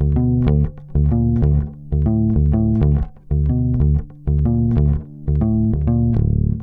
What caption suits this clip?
Music > Solo instrument
blues rock funk riff 4
bass, bassline, basslines, blues, chords, chuny, electric, electricbass, funk, fuzz, harmonic, harmonics, low, lowend, note, notes, pick, pluck, riff, riffs, rock, slap, slide, slides